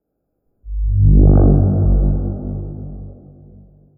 Sound effects > Other mechanisms, engines, machines
robowhoosh electro 1
emission, synth, electronic, bass, science, robot, alienware, space, electro, electricity, electrohum, UFO, techno, buzz, whoosh, electrobuzz, electrowhoosh, electrical, alien, discharge, woosh, robotic, plasma, electric, hum, electroresonance, electrons, spark, abstract, soundesign